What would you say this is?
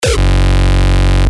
Instrument samples > Percussion
Frechcore kick Testing 1-#F 195bpm
Bass synthed with phaseplant only.
Kick, hardcore, Distorted